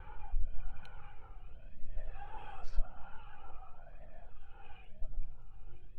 Speech > Processed / Synthetic
Creepy whispers sound Recorded with a Rode NT1 Microphone